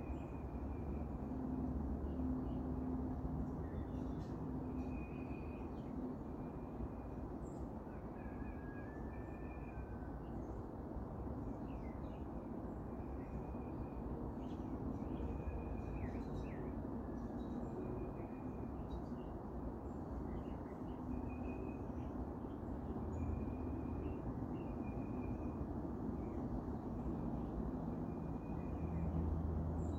Soundscapes > Nature
AMBForst-Samsung Galaxy Smartphone, MCU Suburban, Birdsong, Distant Weedwhacker in Middle Nicholas Judy TDC
A suburban forest birdsong with distant weedwhacker in the middle of a soundtrack.
distant, nature, forest, weedwhacker, Phone-recording, suburban, birdsong, ambience, field-recording